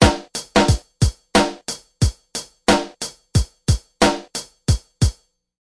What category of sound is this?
Music > Solo percussion